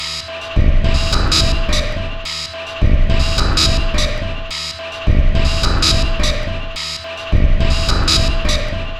Instrument samples > Percussion
This 213bpm Drum Loop is good for composing Industrial/Electronic/Ambient songs or using as soundtrack to a sci-fi/suspense/horror indie game or short film.
Samples, Drum, Underground, Alien, Loop, Weird, Packs, Dark, Loopable, Ambient, Industrial, Soundtrack